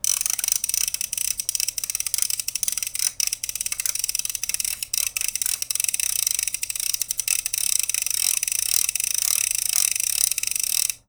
Sound effects > Objects / House appliances
A noisemaker ratchet.